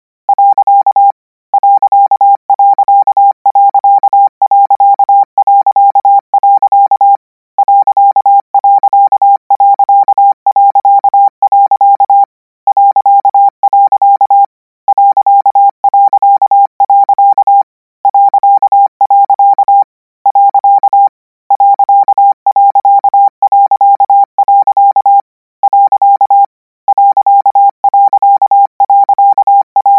Sound effects > Electronic / Design
Practice hear symbol '.' use Koch method (practice each letter, symbol, letter separate than combine), 200 word random length, 25 word/minute, 800 Hz, 90% volume.